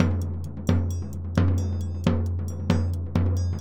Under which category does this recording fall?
Music > Solo percussion